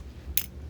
Sound effects > Objects / House appliances
TOOLMisc allen key modern using DOI FCS2
key, TOOL